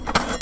Sound effects > Other mechanisms, engines, machines
metal shop foley -068
shop, foley, sfx, strike, rustle, percussion, boom, bam, perc, knock, little, oneshot, bang, tink, sound, pop, metal, bop, crackle, tools, fx, wood, thud